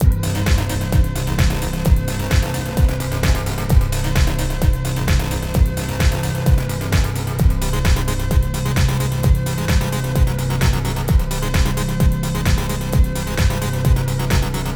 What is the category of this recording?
Music > Multiple instruments